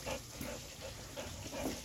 Sound effects > Animals
ANMLDog Jasper Panting Nicholas Judy TDC
A dog panting. Performed by Jasper, the Judy family's dog.
breathing, dog, panting, Phone-recording